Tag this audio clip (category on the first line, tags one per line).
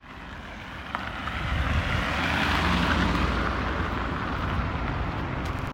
Soundscapes > Urban
Cars
Road
Transport